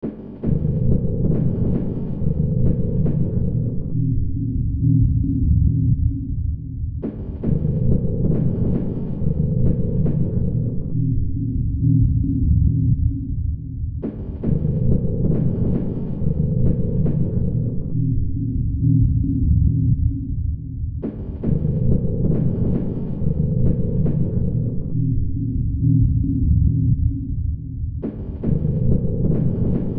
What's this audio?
Soundscapes > Synthetic / Artificial
Looppelganger #178 | Dark Ambient Sound
Use this as background to some creepy or horror content.
Sci-fi, Darkness, Games, Survival, Gothic, Noise, Silent, Ambience